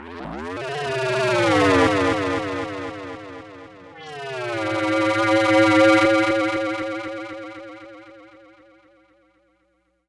Sound effects > Experimental
Analog Bass, Sweeps, and FX-060
retro, basses, robot, korg, alien, mechanical, sfx, sci-fi, electronic, bass, sweep, oneshot, analog, scifi, pad, synth, trippy, machine, vintage, weird, bassy, dark, electro, robotic, analogue, complex, effect, fx, snythesizer, sample